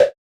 Instrument samples > Synths / Electronic
A wood-like percussion made in Surge XT, using FM synthesis.